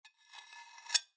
Sound effects > Objects / House appliances
A recording of a kitchen knife being scraped slowly over a sharpener.
friction, kitchen, knife, metal, scraping, slow